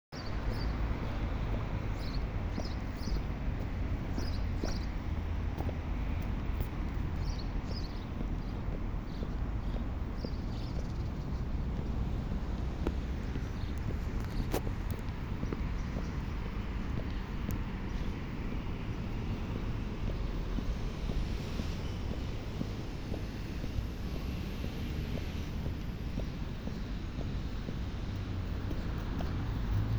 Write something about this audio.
Soundscapes > Urban
20250513 0916 city walk phone microphone
atmophere, field, recording